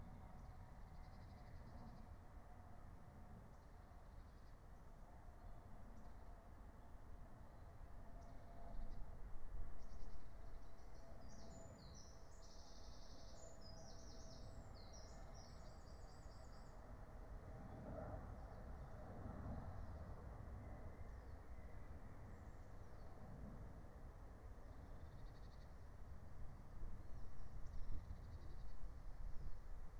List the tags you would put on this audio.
Soundscapes > Nature
field-recording
meadow
nature
natural-soundscape
phenological-recording
raspberry-pi
soundscape
alice-holt-forest

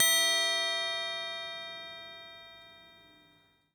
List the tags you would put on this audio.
Instrument samples > Other
balls
closerecording

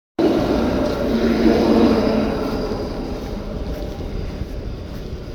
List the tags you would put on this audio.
Soundscapes > Urban
recording
Tampere
tram

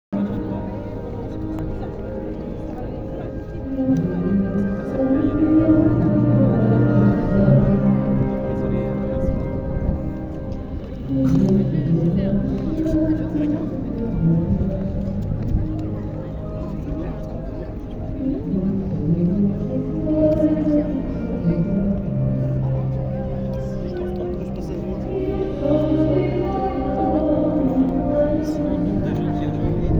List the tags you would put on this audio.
Soundscapes > Urban
atmophere
field
recording

